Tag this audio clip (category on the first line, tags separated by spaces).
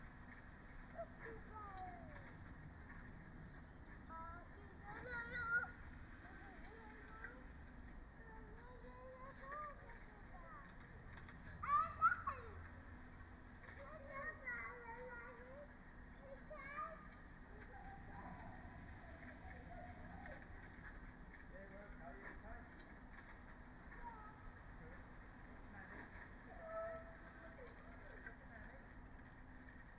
Soundscapes > Nature
phenological-recording raspberry-pi field-recording alice-holt-forest nature soundscape Dendrophone sound-installation artistic-intervention weather-data modified-soundscape natural-soundscape data-to-sound